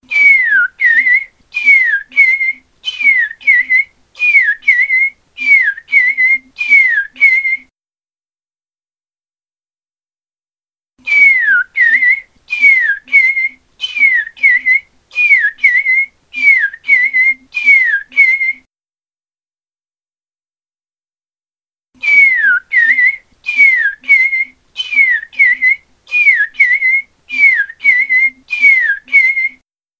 Sound effects > Animals
TOONAnml-Blue Snowball Microphone, CU Bird Call, Robin Nicholas Judy TDC
A robin. Human Imitation.
bird, Blue-brand, Blue-Snowball, call, cartoon, human, imitation, robin